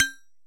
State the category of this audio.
Sound effects > Objects / House appliances